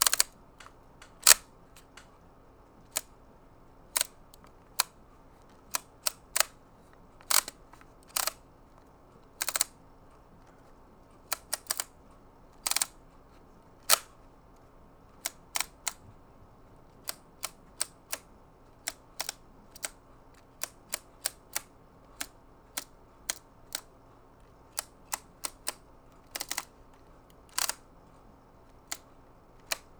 Sound effects > Objects / House appliances
COMCam-Blue Snowball Microphone, CU Fuji Instax Mini 9 Camera, Ratchet Dial Turn Nicholas Judy TDC
A Fuji Instax Mini 9 camera ratchet dial turning.